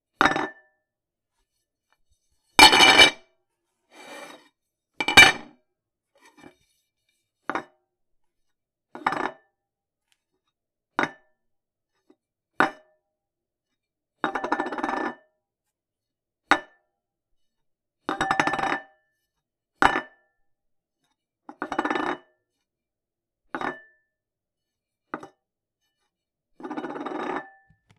Sound effects > Objects / House appliances
Picking up and dropping a ceramic plate on a countertop.